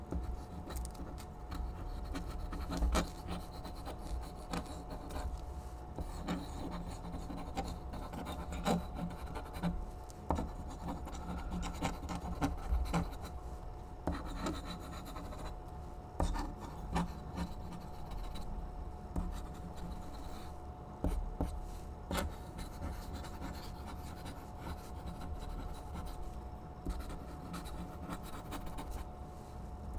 Sound effects > Objects / House appliances
OBJWrite-Blue Snowball Microphone, MCU Quill, Pen, Writing Nicholas Judy TDC
A quill pen writing.